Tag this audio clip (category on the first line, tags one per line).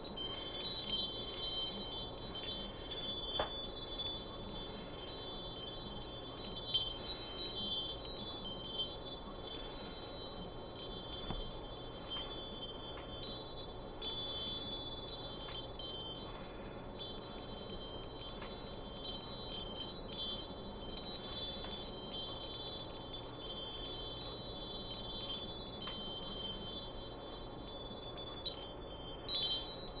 Music > Solo percussion
Blue-brand
Blue-Snowball
chimes
dream
fairy
fantasy
magic
small
sparkle
tinkle
wind-chimes